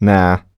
Speech > Solo speech
Displeasure - Naahh
Single-take, Naah, FR-AV2, oneshot, voice, singletake, displeasured, Neumann, displeasure, NPC, nope, Tascam, Video-game, sound, Man, Nahh, Nah, dialogue, Voice-acting, U67, Vocal, Male, no, refusal, talk, Human, Mid-20s